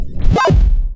Instrument samples > Synths / Electronic
CVLT BASS 12
wavetable, sub, drops, clear, subwoofer, wobble, low, lowend, synthbass, lfo, subs, stabs, synth, subbass, bass, bassdrop